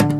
Music > Solo instrument
Acoustic Guitar Oneshot Slice 56
acoustic, chord, foley, fx, guitar, knock, note, notes, oneshot, pluck, plucked, sfx, string, strings, twang